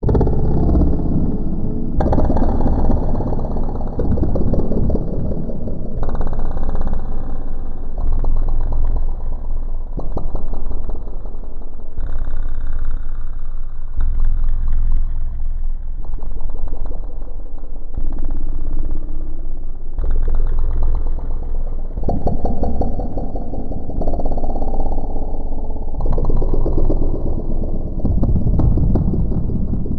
Soundscapes > Other
Reverberations and Delays Ambient Soundscape Texture
Experimental reverbs and delays produced with Torso S4 Noise is picked up, granulized, pitched and then thrown inside a reverb and delay chamber The size of the grain is modulated Sounds like something coming from the space :)
ambient, delay, echo, experimental, reverb, reverberation, soundscape, space